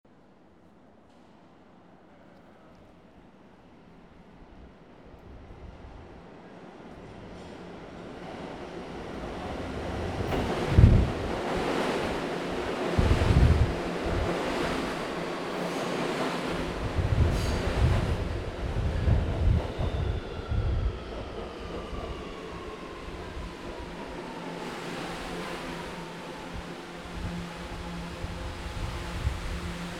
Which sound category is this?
Sound effects > Vehicles